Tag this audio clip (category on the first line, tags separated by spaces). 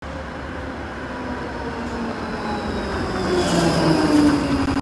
Soundscapes > Urban
streetcar tram transport